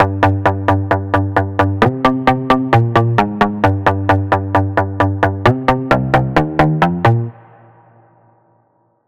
Music > Solo instrument
bass guitar 2 - gm 132 bpm
a little fun trying to synthesize realistic guitars
guitar, bass, pluck, string